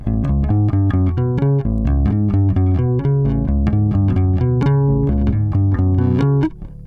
Music > Solo instrument
scale down riff
bass, bassline, basslines, blues, chords, chuny, electric, electricbass, funk, fuzz, harmonic, harmonics, low, lowend, note, notes, pick, pluck, riff, riffs, rock, slap, slide, slides